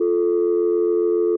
Instrument samples > Synths / Electronic
Landline Phonelike Synth F5
Holding-Tone, JI, JI-3rd, JI-Third, just-minor-3rd, just-minor-third, Landline, Landline-Holding-Tone, Landline-Phone, Landline-Phonelike-Synth, Landline-Telephone, Landline-Telephone-like-Sound, Old-School-Telephone, Synth, Tone-Plus-386c